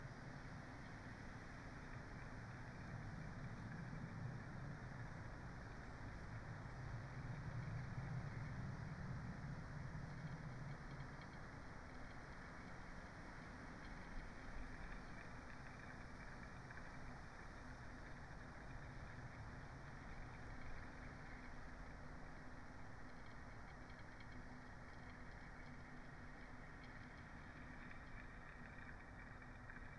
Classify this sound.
Soundscapes > Nature